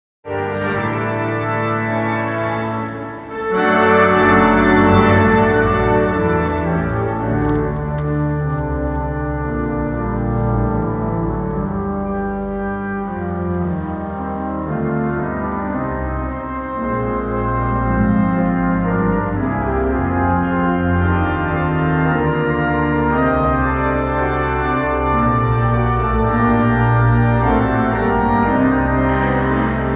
Music > Solo instrument
Sunday at 13-40
Sunday afternoon organ music at Rochester Cathedral in 2025